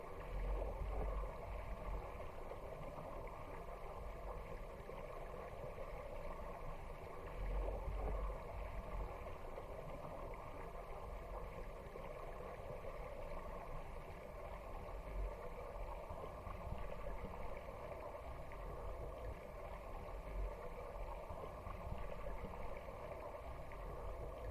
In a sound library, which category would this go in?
Sound effects > Natural elements and explosions